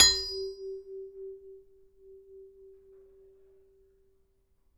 Sound effects > Other mechanisms, engines, machines
metal shop foley -035
bam, bop, crackle, fx, knock, metal, perc, pop, tink, tools, wood